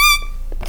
Sound effects > Objects / House appliances
mouth foley-009 squeek
mouth sfx recorded with tascam field recorder